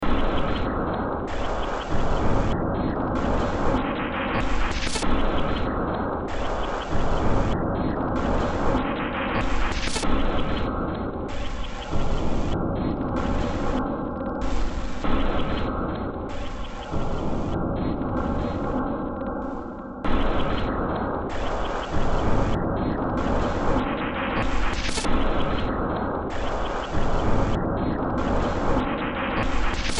Music > Multiple instruments
Demo Track #3055 (Industraumatic)
Ambient, Industrial